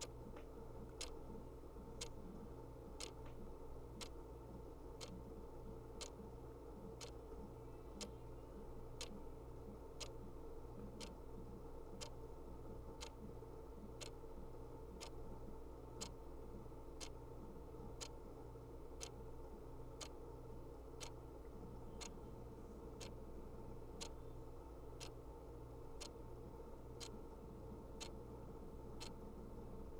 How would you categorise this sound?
Sound effects > Objects / House appliances